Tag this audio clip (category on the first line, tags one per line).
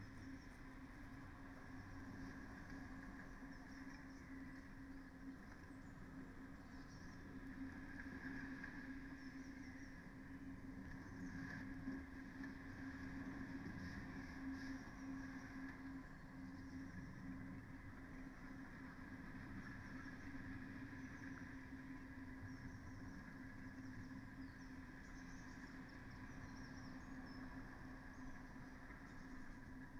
Soundscapes > Nature
field-recording
artistic-intervention
soundscape
sound-installation
weather-data
modified-soundscape
raspberry-pi
data-to-sound
natural-soundscape
phenological-recording
Dendrophone
alice-holt-forest
nature